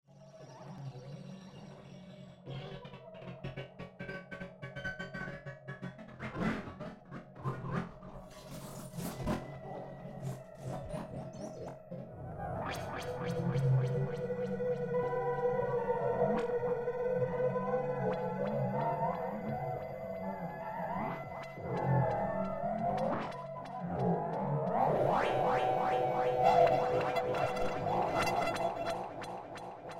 Music > Other
sol mysticTrain
granular processing of sample